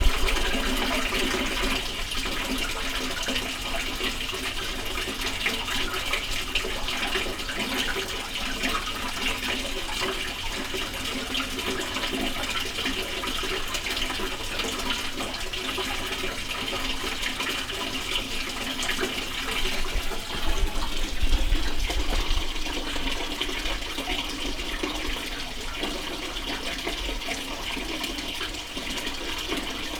Sound effects > Other
Rain Water flowing through Pipe
Recorded in the parking garage of my apartment building while it was raining. Big pipes drain the rainwater to the sewer and it's a sloshy constant flow of water Recorded using an external Rode video shotgun mic on a Zoom H1essential recorder
rain, rainwater, water, trickle, stream